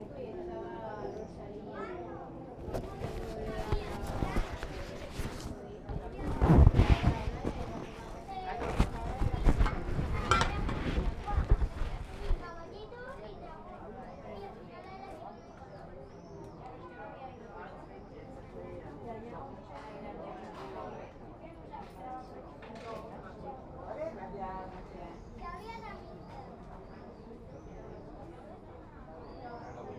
Soundscapes > Urban
AMB EXTERIOR terraza heladeria frente iglesia Peniscola Viva los novios 250607
Exterior ambience having a drink in a bar, next to Peñíscola's church (next to the castle). The family of the bride aproaches and takes a seat during the recording, then, someone yells "VIVA LOS NOVIOS!". Recorded using the interanal mics of the Sony PCM M10 (with Rycote windfur), hidden inside a backpack, with capsules/fur poking out. You can hear me and my family speaking in a closer term, mixing English and Spanish languages.
heladeria, crowd, sillas, vivalosnovios, peniscola, bar, jaleo, field-recording, exterior, people, ambience, gente, walla, boda